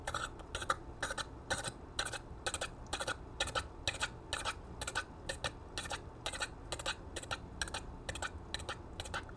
Sound effects > Human sounds and actions
TOONAnml-Blue Snowball Microphone, CU Horse, Gallop, Human Imitation Nicholas Judy TDC
A horse galloping. Human imitation.
Blue-brand, Blue-Snowball, gallop, horse, human, imitation